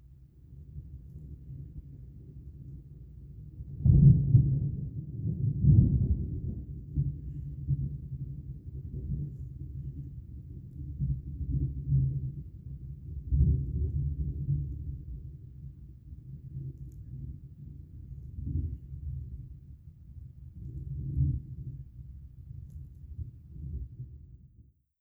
Sound effects > Natural elements and explosions
Thunder rumbling from interior of house. LFE.